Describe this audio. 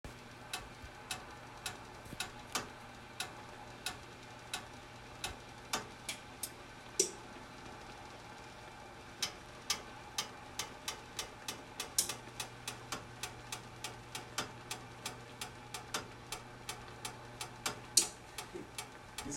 Sound effects > Objects / House appliances
stove clicks
The sound of my old rental stove creaking as the burner heats up. It's rather rhythmic.